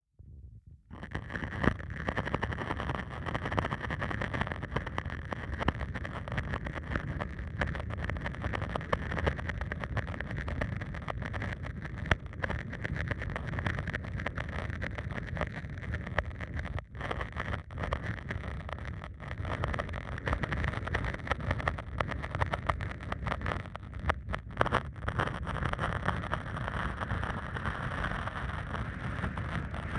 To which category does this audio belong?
Sound effects > Other